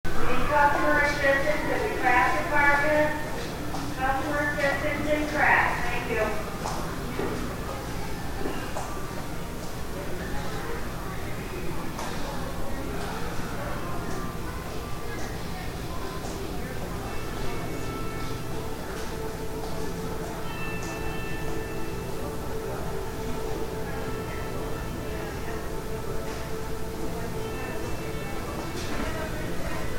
Soundscapes > Indoors
Inside of a department store, human voices, footsteps, announcement on PA, background music
grocery, supermarket, department, ambience, shopping, shop, store, indoor